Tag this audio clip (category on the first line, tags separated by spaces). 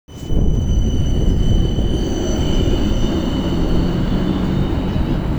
Sound effects > Vehicles
rail vehicle tram